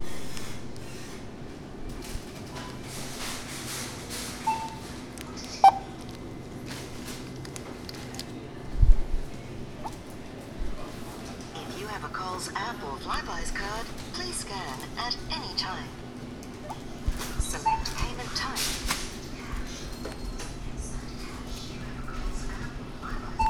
Soundscapes > Nature
Atmos Self-Service Checkout Coles Australia
Atmos Self Service Checkout Coles Australia Zoom H1n
Atmos, Australia, Checkout, Coles, Supermarket